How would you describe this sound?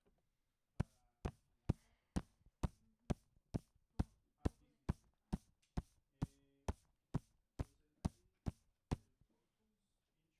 Sound effects > Human sounds and actions
I use my notebook and rub my fist onto it but I used the back instead the front
notebook, sounddesign